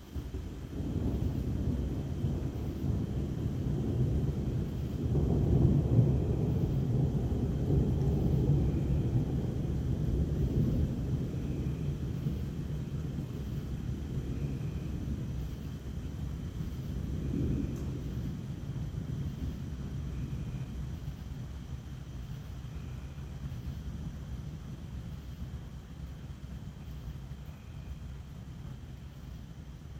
Sound effects > Natural elements and explosions
THUN-Samsung Galaxy Smartphone, CU Thunder, Big, Rumble Nicholas Judy TDC
Big thunder rumbles.
Phone-recording, rumble